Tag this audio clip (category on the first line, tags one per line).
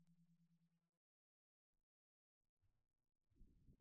Music > Solo percussion
drumkit
perc
drums
quality
recording
toms
beat
kit
real
realdrum
maple
drum
roll
oneshot
flam
Tom
med-tom
loop
wood
percussion
acoustic
Medium-Tom
tomdrum